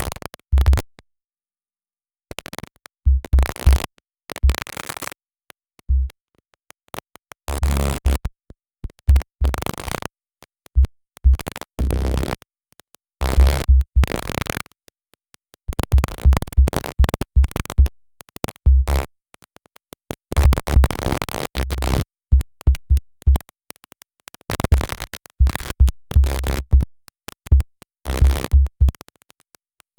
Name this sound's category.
Soundscapes > Synthetic / Artificial